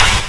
Instrument samples > Percussion
crash China electro 1 brief
crash, Stagg, cymbals, cymbal, sinocymbal, metallic, china, polycrash, clang, Avedis, multicrash, Sabian, Istanbul, low-pitched, Zultan, crack, spock, Meinl, Zildjian, smash, clash, Paiste, Soultone, sinocrash, bang